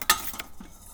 Sound effects > Other mechanisms, engines, machines
metal shop foley -168
bam, fx, metal, knock, percussion, pop, thud, oneshot, wood, foley, crackle, tink, bang, rustle, boom, perc, sound, sfx, tools, shop, bop, little, strike